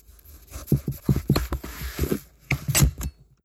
Sound effects > Objects / House appliances
FOODCook-Samsung Galaxy Smartphone, CU Lime, Slice Nicholas Judy TDC
A lime being sliced.
lime, Phone-recording